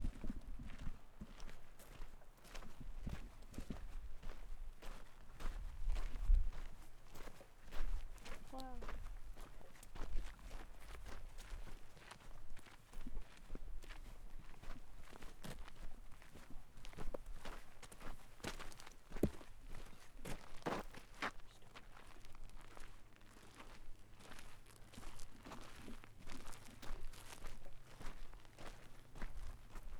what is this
Soundscapes > Nature
Walking to the meadow at Silver Salmon Creek, Alaska. Some handling noise at beginning and end.
Birdsong, Meadow